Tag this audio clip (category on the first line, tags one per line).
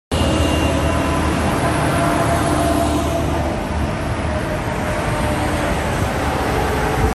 Sound effects > Vehicles
highway
road
truck